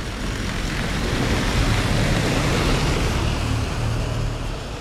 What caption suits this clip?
Sound effects > Vehicles
Bus engine sound